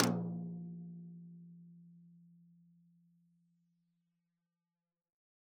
Music > Solo percussion
Med-low Tom - Oneshot 28 12 inch Sonor Force 3007 Maple Rack
drumkit, maple, med-tom, quality, real, realdrum, Tom, tomdrum